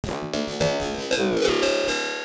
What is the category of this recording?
Sound effects > Electronic / Design